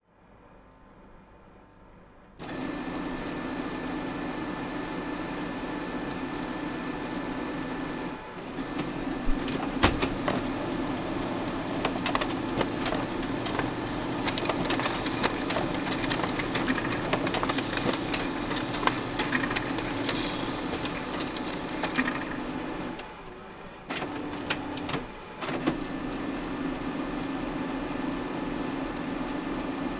Sound effects > Objects / House appliances
MACHOff Laser Printer
Recording using a Zoom H6 Stereo plus contact mike of an Old Brother MFC-9330CDW laser printer. Printed 3 Pages
machine, office, laser, sfx, printer, print, mechanical, printing